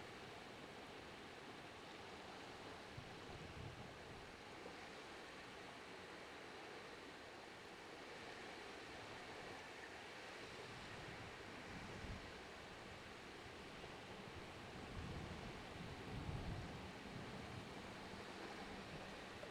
Soundscapes > Nature
Small waves on beach on a windy day
Tascam DR-60 LOM Uši Pro (pair)
beach, wave, waves, sea, shore, coast